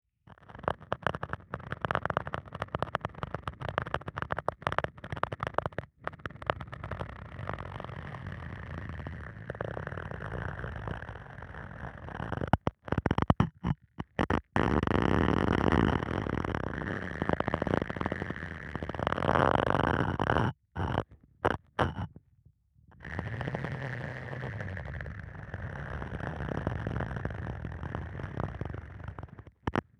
Sound effects > Other
I used the Jez Riley French 'Ecoutic' contact microphone with probe to record the probe moving along the outside of a beanbag.
movement, Contact, Mic, Scratch, friction, scrathing